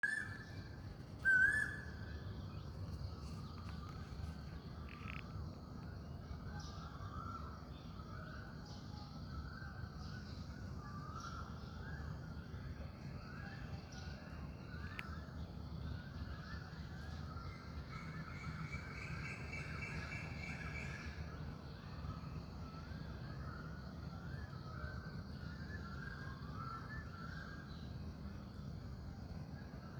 Soundscapes > Nature
Asian Koel in Nature – Smartphone Recording (Wind & Traffic Background)
Asian Koel (Cuckoo) bird singing clearly in the morning. Recorded via smartphone in a real natural environment. The audio captures authentic outdoor textures, including subtle background birds, light wind hitting the microphone, and faint traffic noise from a distance.